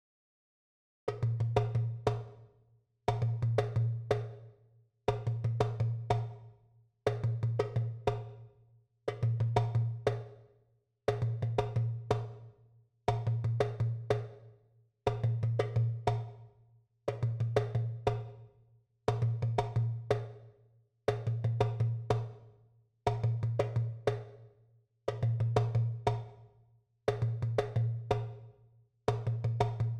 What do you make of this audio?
Music > Solo percussion
Rumba Criolla 120 BPM
rythm, percussion, Rumba, criolla